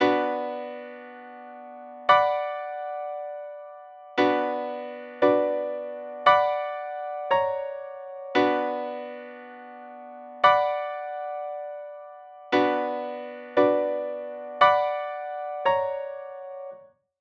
Music > Solo instrument
CHOP IN
melancholic, keys, film, minor, piano, cinematic